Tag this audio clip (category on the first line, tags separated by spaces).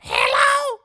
Speech > Solo speech
cute voice